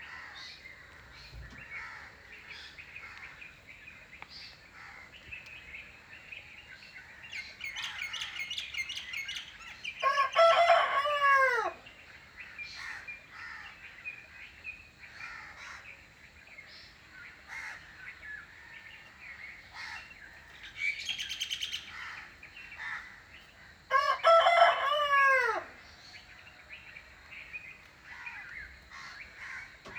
Soundscapes > Other
AMB-Morning,Rooster,Closeup,Crow,OtherBirds-Satungal,Kathmandu,Nepal-12Jul2025-0504H
Morning ambience with rooster, crow, and other birds recorded with iPhone 14 internal microphone. Recorded in Satungal, Kathmandu, Nepal.